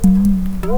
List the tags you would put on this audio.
Sound effects > Objects / House appliances
natural fieldrecording foundobject oneshot industrial foley mechanical drill bonk object fx clunk stab sfx perc metal glass percussion hit

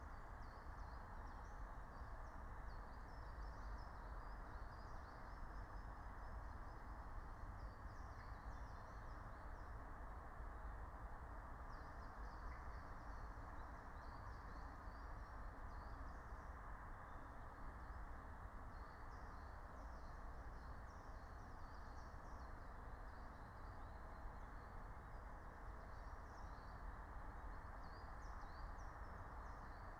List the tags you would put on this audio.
Soundscapes > Nature
natural-soundscape alice-holt-forest raspberry-pi

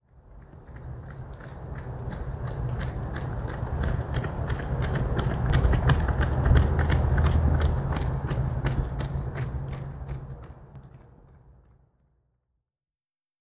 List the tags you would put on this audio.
Sound effects > Human sounds and actions
foley jogger Phone-recording run-by

